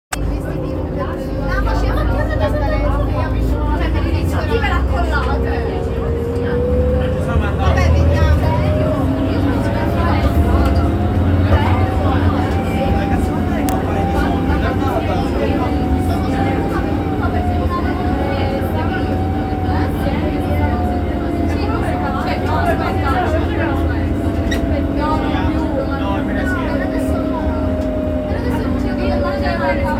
Soundscapes > Urban
On a Bus in Palermo
Palermo Bus, people chatting